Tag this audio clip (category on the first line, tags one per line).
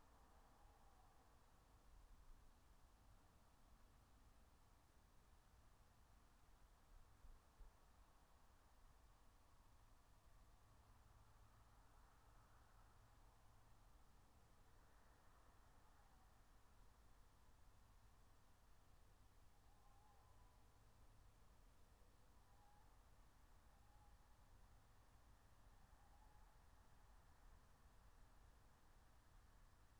Soundscapes > Nature
meadow
natural-soundscape
phenological-recording